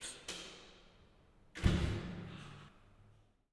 Sound effects > Objects / House appliances
Hotel room door closing from corridor (faraway perpective)
This is the sound a keycard-operated hotel room door makes when recorded closing from outside the room. The electronic mechanism can be heard after the door shuts, locking the door. Perspective: long shot or faraway.
closing, echoey, faraway, hotel, lock, long-shot